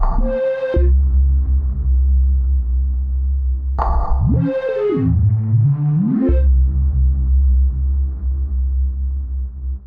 Instrument samples > Synths / Electronic
CVLT BASS 22
bass, bassdrop, clear, drops, lfo, low, lowend, stabs, sub, subbass, subs, subwoofer, synth, synthbass, wavetable, wobble